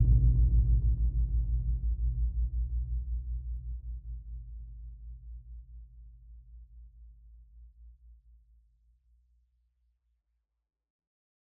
Sound effects > Electronic / Design
ELECTRIC BOOMY LASTING EXPLOSION
DEEP, LOW, EXPLOSION, DIFFERENT, BASSY, HIT, INNOVATIVE, TRAP, UNIQUE, HIPHOP, EXPERIMENTAL, RAP, BOOM, IMPACT, RUMBLING, RATTLING